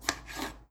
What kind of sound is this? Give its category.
Sound effects > Objects / House appliances